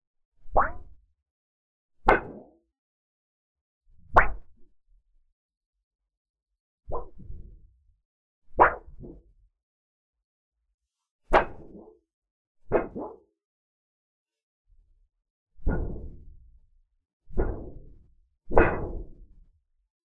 Sound effects > Other
Wobbleboard - Impacts and Hits
The sounds of a sheet of thin plastic wobbling back and forth in singular, quick, harsh motions. Could be used for accentuating dizziness, unbalance, or bouncing on something squishy like a large belly, jello, breasts, drums, bubbles, etc.
gelatin, wobbleboard, wobbling, wobble, boink, breasts, plastic